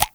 Sound effects > Objects / House appliances
Pill Bottle Cap Open 3
pill, close, meds, open, Pills, drugs, shaking, shake